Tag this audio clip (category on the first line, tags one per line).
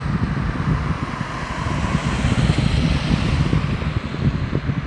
Soundscapes > Urban
city
car
tyres
driving